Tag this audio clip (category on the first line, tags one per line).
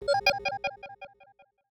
Sound effects > Electronic / Design
alert
confirmation
digital
interface
message
sci-fi
selection